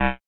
Sound effects > Electronic / Design
CLICKY GRASSY SERVER LEAK
SYNTHETIC, INNOVATIVE, EXPERIMENTAL, BEEP, HIT, CIRCUIT, OBSCURE, HARSH, SHARP, BOOP, DING, UNIQUE, COMPUTER, ELECTRONIC, CHIPPY